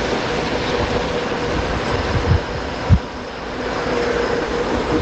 Sound effects > Vehicles
tram passing by medium speed
Tram passing by in a busy urban environment at a steady medium speed. Recorded from an elevated position near the tram tracks, using the default device microphone of a Samsung Galaxy S20+. TRAM: ForCity Smart Artic X34
tram, transport, urban